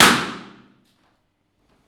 Soundscapes > Urban
Balloon pop in stair well. - Recorded myself on a handheld zoom recorder around 2 metres away from the balloon.
impulse, response, reverb